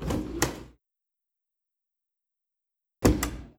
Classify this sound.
Sound effects > Objects / House appliances